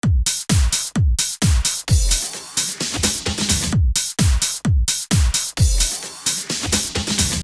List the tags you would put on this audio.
Instrument samples > Percussion
Drums
House
Clap
Drum
Dance
EDM
Music
130
bpm
Slap
Loop
Free
Electro
Kick
Bass
Snare

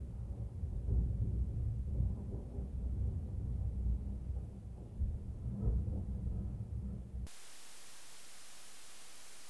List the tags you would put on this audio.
Sound effects > Natural elements and explosions
storm
weather
lightning

